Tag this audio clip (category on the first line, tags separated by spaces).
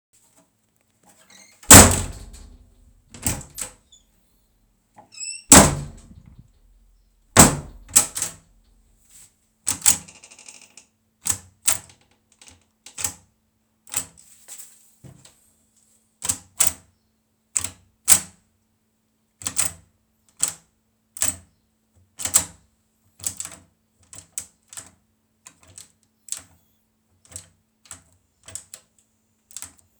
Sound effects > Objects / House appliances
close door lock